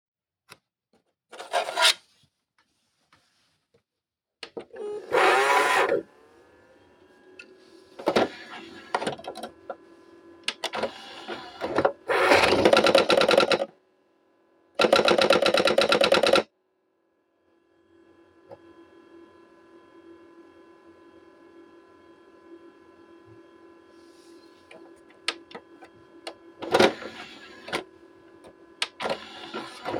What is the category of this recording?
Sound effects > Other mechanisms, engines, machines